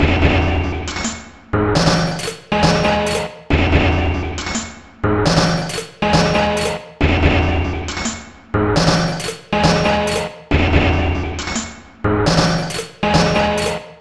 Instrument samples > Percussion
This 137bpm Drum Loop is good for composing Industrial/Electronic/Ambient songs or using as soundtrack to a sci-fi/suspense/horror indie game or short film.
Soundtrack, Drum, Dark, Packs, Loopable, Alien, Weird, Ambient, Industrial, Loop, Underground, Samples